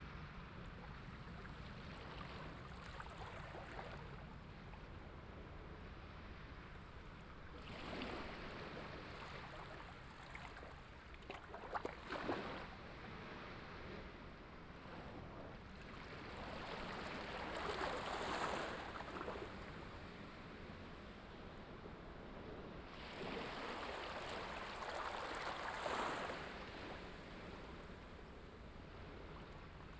Soundscapes > Nature
Calm Sea Waves2- Japan - Binaural
Recorded waves on a beach in a small quiet town in Japan. Recorded with: Zoom H5 Soundman OKM2 Classic
water, beach, waves, japan, binaural, field-recording